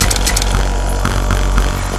Sound effects > Electronic / Design
Industrial Estate 27
120bpm, Ableton, chaos, industrial, loop, soundtrack, techno